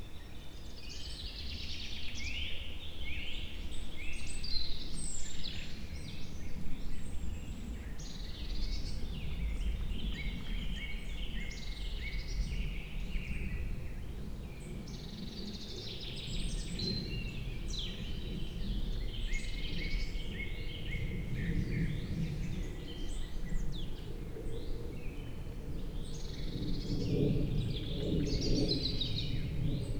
Soundscapes > Nature
250418 11h11 Gergueil Forest Ambience ORTF
Subject : Ambience of a Forrest area WWN from Gergueil. Date YMD : 2025 04 18 11h11 Location : Gergueil France. GPS = 47.24638526182666, 4.804916752904791 Hardware : Tascam FR-AV2, Rode NT5 in a ORTF configuration with WS8 windshield Weather : Half cloudy grey, half sunshine, a little chilly in a t-shirt but ok. (12°ish?) Little to no wind. Processing : Trimmed and Normalized in Audacity. Probably some fade in/out.
2025, 21410, april, Birds, Bourgogne-Franche-Comte, cote-dor, Countryside, Cte-dOr, Field-recording, Forest, FR-AV2, Gergueil, Nature, NT5, Peaceful, Rode, spring, Tascam